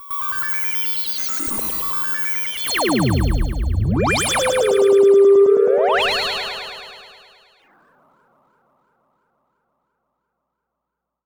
Sound effects > Experimental
Analog Bass, Sweeps, and FX-052
analog, fx, robotic, trippy, bass, complex, vintage, alien, snythesizer, basses, sweep, bassy, machine, effect, pad, dark, sci-fi, sample, retro, sfx, robot, electronic, korg, oneshot, electro, synth, analogue, weird, scifi, mechanical